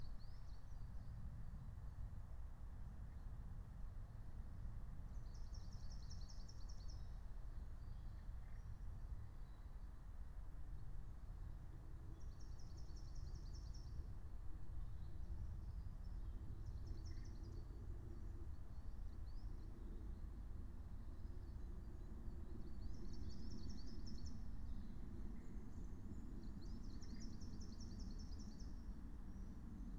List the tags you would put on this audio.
Soundscapes > Nature
alice-holt-forest phenological-recording soundscape

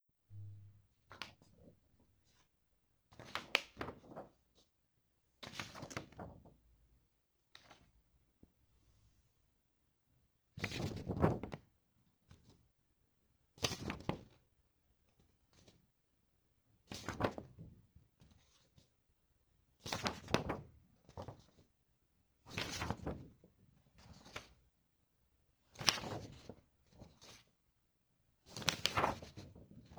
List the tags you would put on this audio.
Sound effects > Objects / House appliances
page pick-up

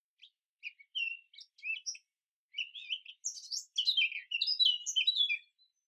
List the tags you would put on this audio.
Sound effects > Animals
Bird birds blackcap chirp field-recording morning nature songbird

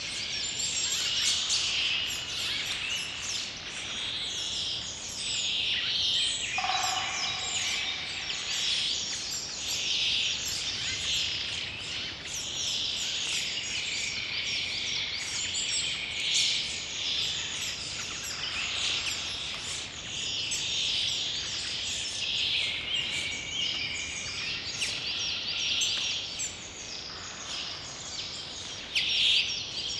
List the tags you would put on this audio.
Soundscapes > Nature
ambience ambient atmosphere background birds birdsong calm environmental European-forest field-recording forest natural nature peaceful Poland rural soundscape wild

